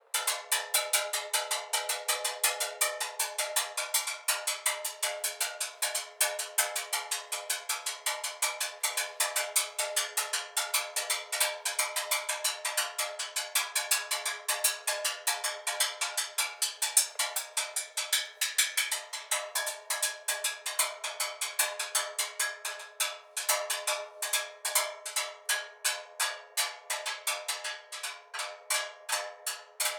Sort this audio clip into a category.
Sound effects > Human sounds and actions